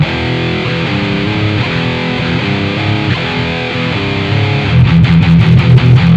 Music > Solo instrument
Guitar Riff T
Guitar riff made with Fender Strato and Amp 5
distorted, electric, guitar, heavy